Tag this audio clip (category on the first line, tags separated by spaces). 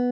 Instrument samples > String
arpeggio cheap design guitar sound stratocaster tone